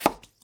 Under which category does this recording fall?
Sound effects > Other